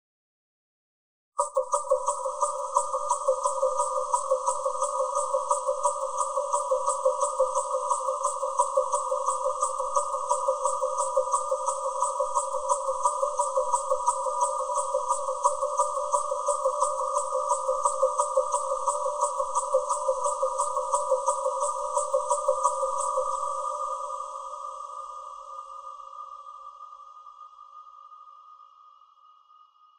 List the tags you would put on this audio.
Music > Solo percussion
Bass-Drum Snare-Drum Interesting-Results FX-Drum-Pattern FX-Drums Four-Over-Four-Pattern Experiments-on-Drum-Patterns Experimental-Production FX-Drum Bass-and-Snare Experiments-on-Drum-Beats Noisy Experimental FX-Laden Silly FX-Laden-Simple-Drum-Pattern Fun Glitchy Simple-Drum-Pattern

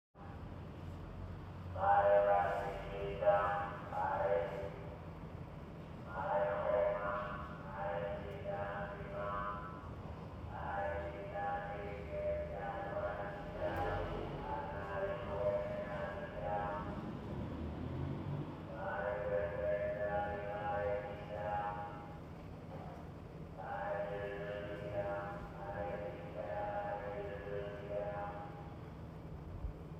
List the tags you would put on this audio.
Speech > Processed / Synthetic
greece street athens voice vendor megaphone

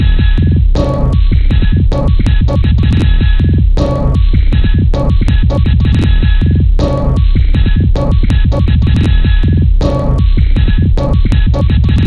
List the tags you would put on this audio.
Instrument samples > Percussion
Loop Drum Weird Industrial Packs Dark Samples Loopable Underground Ambient Alien Soundtrack

